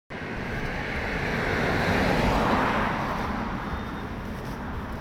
Sound effects > Vehicles
Car 2025-10-27 klo 20.13.02
Car, Field-recording, Finland